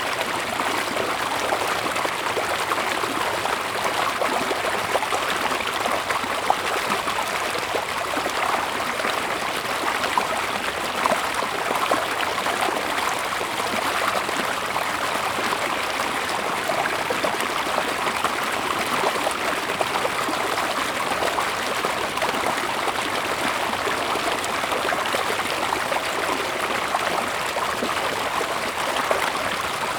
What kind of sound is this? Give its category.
Soundscapes > Urban